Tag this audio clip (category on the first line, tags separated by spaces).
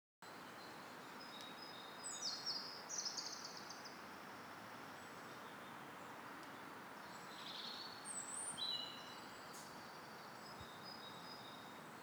Soundscapes > Nature
Bird Birds Birdsong Day Environment Field-recording Forest Nature Park Peaceful Traffic Trees Wind